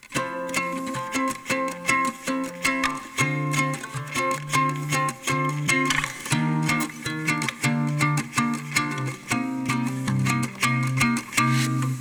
Music > Solo instrument
a doubled phrase of nylon guitar